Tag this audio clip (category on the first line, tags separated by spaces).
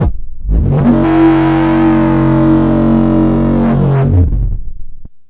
Sound effects > Electronic / Design
alien ambient analog analogue bass dark edm effect electro electronic fantasy filter fx growl heavy industrial loop loopable low lowend noise otherworldly sweep synth synthesizer techno trippy wobble